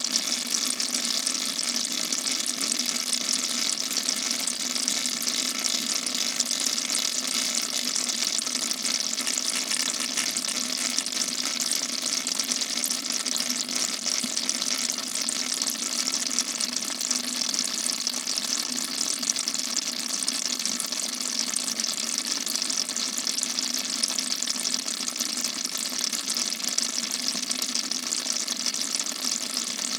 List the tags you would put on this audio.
Sound effects > Animals
ecrevisse gambero crackling water crackle field-recording fishing glaciere cooler clicking crepitement crayfish box

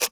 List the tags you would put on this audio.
Sound effects > Other
paper scrunch tear interface game rip ui

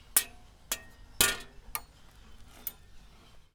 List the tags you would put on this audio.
Sound effects > Objects / House appliances
waste Percussion dumping rubbish Robotic SFX Bash Junkyard Junk Atmosphere scrape rattle trash garbage dumpster Machine Perc Foley Ambience Bang Smash tube Metallic Environment Metal Clang FX Dump Clank Robot

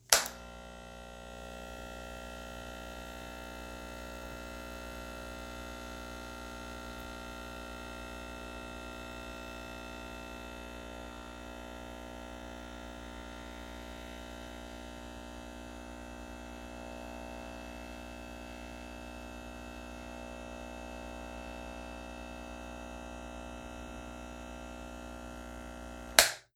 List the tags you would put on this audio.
Sound effects > Objects / House appliances
electric hair-clipper Phone-recording run turn-off turn-on

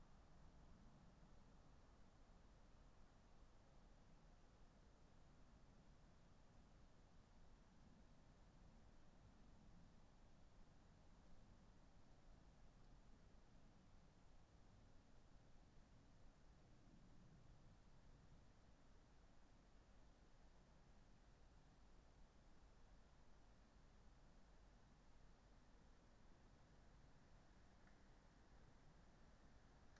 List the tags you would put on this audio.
Soundscapes > Nature
sound-installation alice-holt-forest raspberry-pi artistic-intervention Dendrophone soundscape field-recording nature modified-soundscape data-to-sound weather-data natural-soundscape

